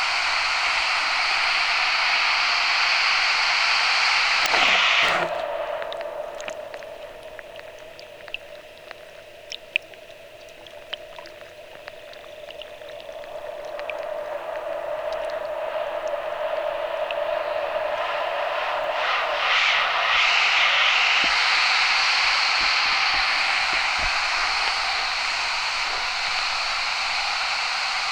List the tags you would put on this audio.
Sound effects > Experimental
81000 Albi FR-AV2 Hydrophone in-out Tascam testing under-water

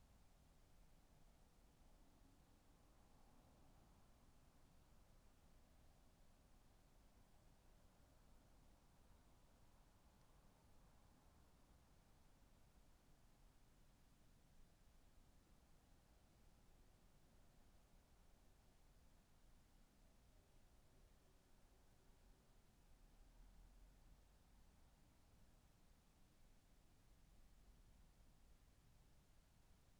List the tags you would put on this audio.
Soundscapes > Nature
nature artistic-intervention Dendrophone sound-installation natural-soundscape modified-soundscape field-recording raspberry-pi soundscape data-to-sound weather-data alice-holt-forest phenological-recording

